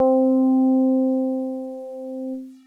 Instrument samples > Synths / Electronic
Electric Piano (FM)
Made in famistudio. Electric piano for musical use.